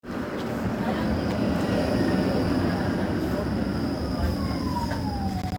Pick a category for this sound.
Soundscapes > Urban